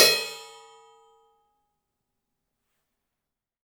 Music > Solo instrument
Cymbal Grab Stop Mute-013
FX, Drums, Cymbals, Kit, Perc, Oneshot, Drum, Paiste, Cymbal, Percussion, Hat, Custom, Crash, GONG, Metal, Ride, Sabian